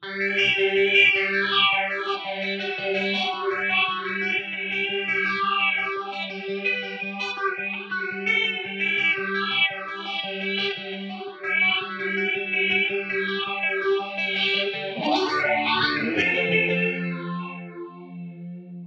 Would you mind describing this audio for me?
Music > Solo instrument
Psycho guitar sequence
chorus, electric, guitar, psycho